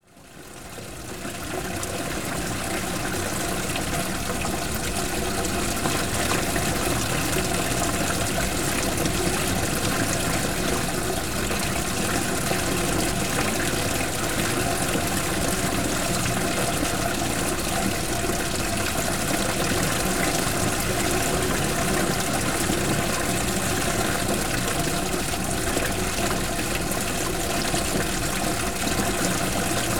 Soundscapes > Nature
A recording of water flowing through a stream in a park.
recording, ambience, water, stream, flowing, field, Re